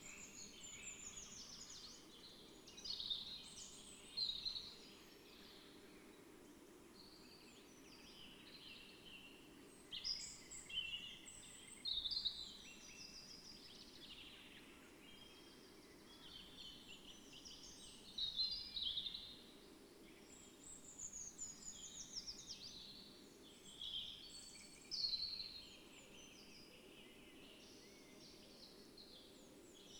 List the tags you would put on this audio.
Soundscapes > Nature
natural-soundscape
nature
Dendrophone
raspberry-pi
data-to-sound
field-recording
weather-data
alice-holt-forest
phenological-recording
soundscape
modified-soundscape
sound-installation
artistic-intervention

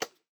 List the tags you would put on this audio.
Sound effects > Human sounds and actions
activation
button
off
switch
toggle

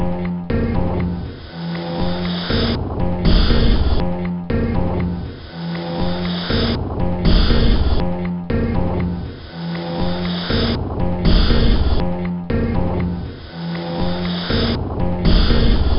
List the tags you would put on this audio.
Instrument samples > Percussion
Ambient
Industrial
Drum
Weird
Packs
Dark
Samples
Alien

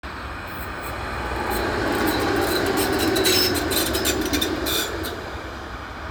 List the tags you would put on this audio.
Soundscapes > Urban
field-recording
railway
Tram

